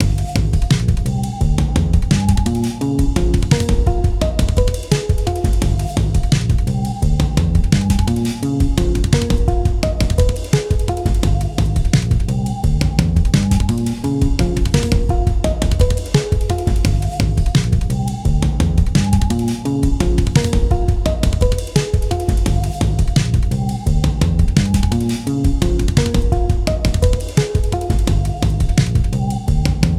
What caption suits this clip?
Music > Multiple instruments
trim pot perc and melody loop 171 bpm
A freaky but chill perc and melody loop I created because im god, FLstudio
etf, synth, percs, drums, beat, cleaner, percussion, 171-bpm, rhythm, per, groovy, melody, weird, garbage, percussion-loop, drum-loop, key, 171bpm, drum, keyloop, loopable, kit, loop, keys